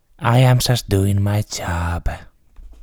Speech > Solo speech
i am just doing my job
man, calm, human, voice, male